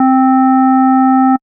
Instrument samples > Synths / Electronic
05. FM-X ODD2 SKIRT2 C3root
FM-X, MODX, Montage, Yamaha